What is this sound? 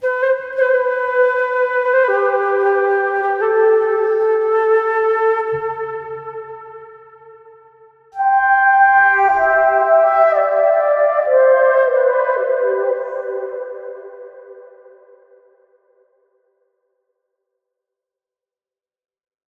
Instrument samples > Wind
A silver flute melody played by RJ Roush and recorded at Studio CVLT in Arcata, CA. Processed in Reaper using Raum and Fab Filter vsts.